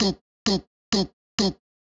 Speech > Solo speech
BrazilFunk Vocal Chop One-shot 4 130bpm
BrazilFunk, FX, One-shot, Vocal